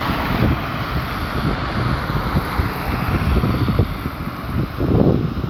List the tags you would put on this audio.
Soundscapes > Urban
vehicle
engine
car

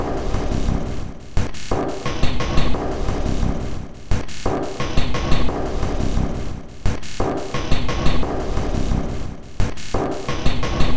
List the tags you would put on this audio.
Instrument samples > Percussion
Loop
Soundtrack
Loopable
Drum
Weird
Industrial
Alien
Packs
Dark
Underground
Samples
Ambient

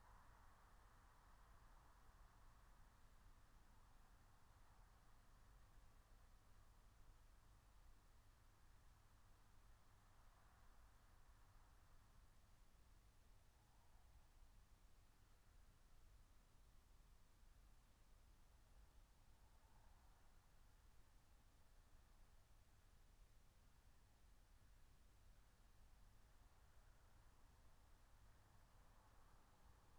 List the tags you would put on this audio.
Soundscapes > Nature
meadow
natural-soundscape
raspberry-pi

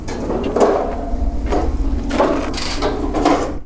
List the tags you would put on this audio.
Sound effects > Objects / House appliances
waste
rubbish
Metal
Dump
Clank
trash
Clang
Robot
SFX
Smash
Environment
scrape
Bang
Junk
Robotic
dumping
Metallic
rattle
Perc
Percussion
Junkyard
garbage
Atmosphere
dumpster
FX
Machine
tube
Foley
Ambience
Bash

